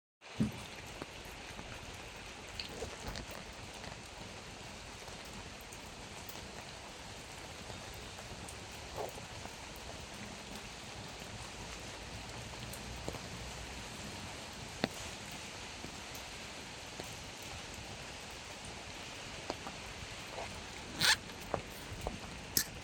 Soundscapes > Nature
rain hits leaves
The rain patters against the dense leaves.
leaves, rain, soundscape